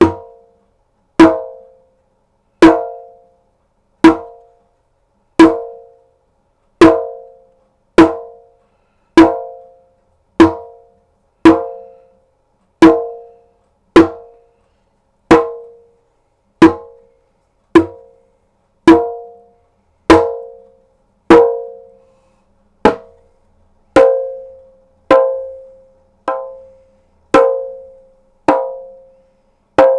Music > Solo percussion
MUSCPerc-Blue Snowball Microphone, CU Djembe, Hits, Many Nicholas Judy TDC
Many djembe hits.
Blue-brand, Blue-Snowball, djembe, hit, jembay, jembe